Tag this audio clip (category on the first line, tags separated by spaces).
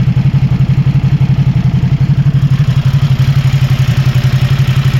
Sound effects > Other mechanisms, engines, machines
Ducati Supersport